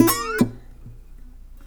Music > Solo instrument
acoustic guitar slide16
solo, riff, instrument, acosutic, dissonant, knock, guitar, pretty, twang, chords, strings, string, slap, chord